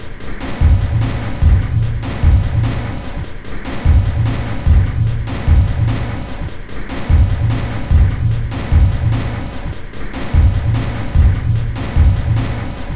Instrument samples > Percussion
This 148bpm Drum Loop is good for composing Industrial/Electronic/Ambient songs or using as soundtrack to a sci-fi/suspense/horror indie game or short film.
Industrial, Ambient, Samples, Drum, Packs, Loop, Dark, Loopable, Alien, Weird, Soundtrack, Underground